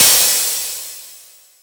Instrument samples > Percussion
Magical Cymbal1
Synthed only with a preset of the Pacter Plugin in FLstudio Yes,only the preset called '' Cymbalism '' I just twist the knobs a night then get those sounds So have a fun!
Cymbal, Enthnic, FX, Magical, Percussion, Synthtic